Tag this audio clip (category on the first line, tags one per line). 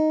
Instrument samples > String
design
tone